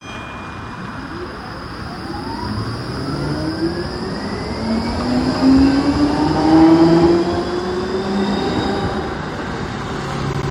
Sound effects > Vehicles
Tram's sound 5
city, field-recording, tram